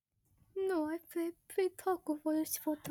Speech > Solo speech
"No I Pay Three Taco For This Photo" Original
Person says "no i pay/paid three taco for this photo" Original
speech, talk